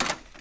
Sound effects > Other mechanisms, engines, machines
metal shop foley -190
perc, little, rustle, knock, crackle, percussion, bang, strike, fx, foley, bam, bop, pop, tools, oneshot, sound, thud, tink, wood, metal, sfx, shop, boom